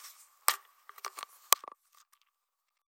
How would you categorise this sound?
Sound effects > Other